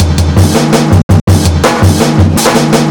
Music > Other
breakbeat loop 165 bpm
FL studio 9 . vst slicex déconstruction de la loop
beat, break, breakbeat, drumbreak, drumloop, drums, groovy, jungle